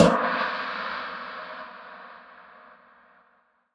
Instrument samples > Percussion
cheapgong fake 1b
I have many alternative versions in my crash folder. A blendfile of low-pitched crashes, a gong and a ride with the intent to be used as an audible crash in rock/metal/jazz music. Version 1 is almost unusable, except if you build sounds. tags: crash China gong fake artificial synthetic unnatural contrived metal metallic brass bronze cymbals sinocymbal Sinocymbal crashgong gongcrash fakery drum drums Sabian Soultone Stagg Zildjian Zultan low-pitched Meinl smash metallic Istanbul